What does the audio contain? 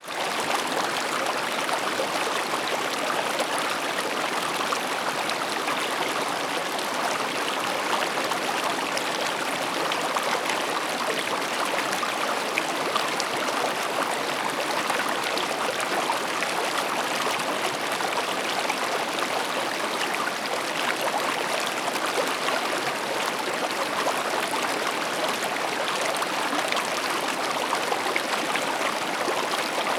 Soundscapes > Nature
Water flowing around rocks in a fast flowing creek. Recorded inches away from the waters surface.
ambience, babbling, brook, creek, field-recording, flow, flowing, flwoing, gurgle, rocks, sfx, stream, trickle, water